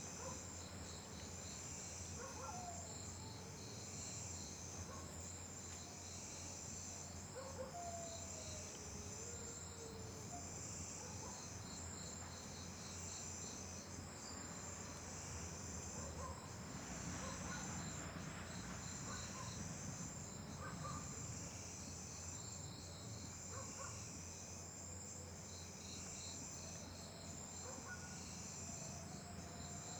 Soundscapes > Nature
Night Alley Ambience – Crickets and Distant Dogs (Goa)